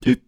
Sound effects > Human sounds and actions
Noises - Gasp
air, dialogue, FR-AV2, gasp, Human, inhale, Male, Man, Mid-20s, Neumann, NPC, oneshot, oups, scared, Single-take, small-fright, talk, Tascam, U67, Video-game, Vocal, Voice-acting